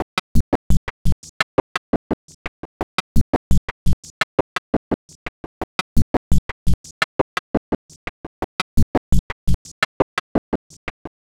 Sound effects > Experimental
This 171bpm Glitch Loop is good for composing Industrial/Electronic/Ambient songs or using as soundtrack to a sci-fi/suspense/horror indie game or short film.
Alien, Ambient, Dark, Drum, Industrial, Loop, Loopable, Packs, Samples, Soundtrack, Underground, Weird